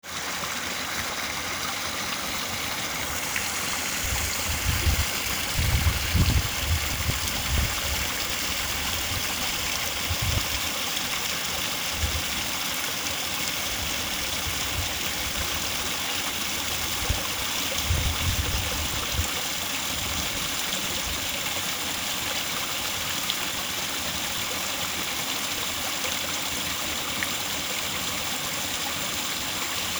Sound effects > Natural elements and explosions
Fram a medium size stream in Hvalvik, Faroe Islands. Recorded with Samsung phone.
water, creek, Stream